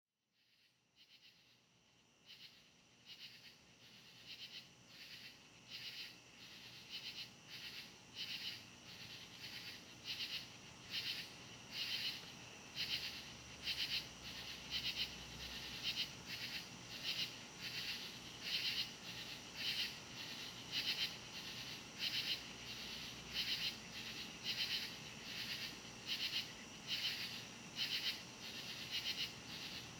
Soundscapes > Nature
Crickets Katydids and Some Windchimes 72425
Condo deck recording of katydids and crickets chirping away during a Summer evening. An occasional light breeze "activates" my wind chimes. Recorded with a Zoom H6 Essential. Edited with AVS Audio editor.
bugs, crickets, insects, katydids, wind-chimes